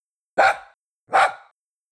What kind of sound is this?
Sound effects > Animals
Shih Tzu Barking 2
Another sound effect of a Shih Tzu barking. It only has been edited to remove background noise of either side of clip and also volume was amplified by 5db overall due to a quiet recording. Made by R&B Sound Bites if you ever feel like crediting me ever for any of my sounds you use. Good to use for Indie game making or movie making. Get Creative!